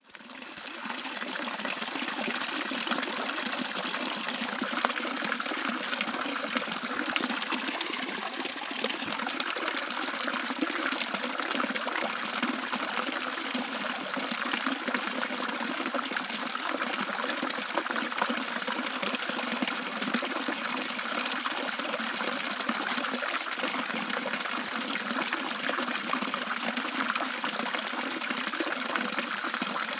Soundscapes > Nature
Small Stream Small Waterfall
creek, Water, river, waterfall, stream, waterstream